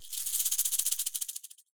Instrument samples > Percussion
Dual shaker-007
recording, percusive